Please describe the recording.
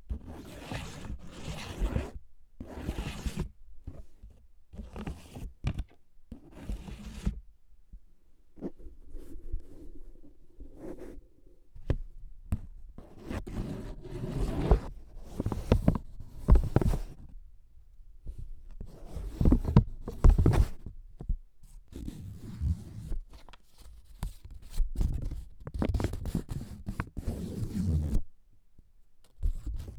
Sound effects > Objects / House appliances
Dare2025-10 Friction - 200EGP pound note
Subject : A recording made for Friction series of dare, Dare2025-10 "Cardboard / Paper". Rubbing a 200 Egyptian pound note. Hardware : Zoom H5 XY. Flimsy recording setup on pillow or something. Weather : Processing : Trimmed in Audacity probably some slicing. Normalised.